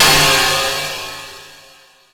Instrument samples > Percussion
Synthed only with a preset of the Pacter Plugin in FLstudio Yes,only the preset called '' Cymbalism '' I just twist the knobs a night then get those sounds So have a fun!